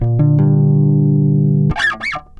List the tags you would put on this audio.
Instrument samples > String
rock
slide
charvel
riffs
bass
electric
pluck
oneshots
funk
loop
fx
plucked
blues
mellow
loops

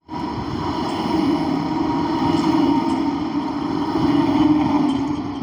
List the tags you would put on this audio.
Sound effects > Vehicles
drive
tram
vehicle

